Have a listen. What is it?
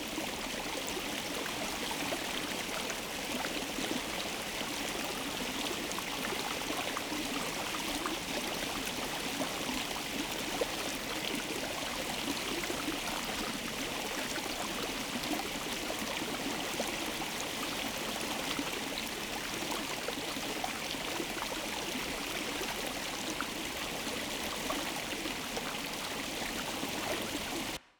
Soundscapes > Nature
Fountain in Ticino - 4 Mics
Recording of a Fountain in Ticino with the Tascam Portacapture x6 and two Audioline CM3 microphones. The four tracks were mixed together in Cubase and slightly edited (EQ).